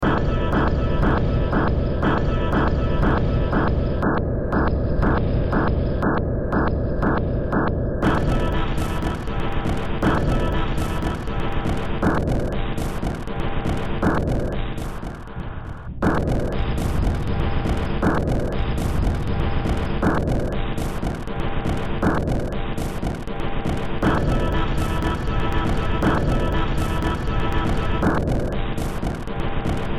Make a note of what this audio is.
Music > Multiple instruments
Short Track #3821 (Industraumatic)
Ambient, Cyberpunk, Games, Horror, Industrial, Noise, Sci-fi, Soundtrack, Underground